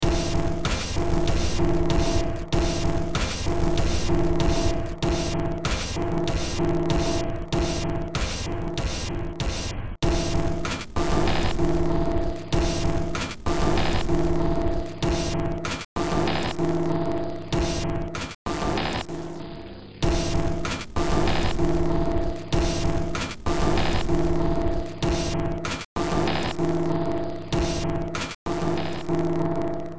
Music > Multiple instruments
Short Track #3664 (Industraumatic)
Underground, Sci-fi, Industrial, Ambient, Horror, Games, Soundtrack